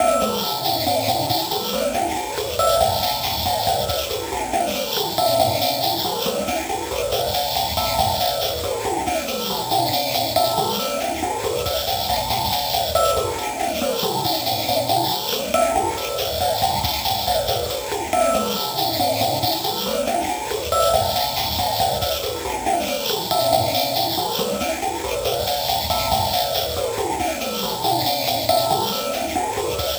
Music > Multiple instruments
An excerpt of a track I am working on, in which the drums present are heavily modulated variants of my foley; second of two drum loops. To clarify, all of the drums used are foley recorded by myself. The BPM of the loop is 139; the time signature is 3/4. This would be suitable for an eery-unsettling piece.